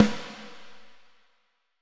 Music > Solo percussion
Snare Processed - Oneshot 54 - 14 by 6.5 inch Brass Ludwig
hits, drums, realdrum, ludwig, reverb, processed, drum, rimshots, oneshot, beat, rimshot, crack, hit, perc, snares, fx, realdrums, rim, snareroll, percussion, brass, kit, snare, acoustic, roll, drumkit, flam, sfx, snaredrum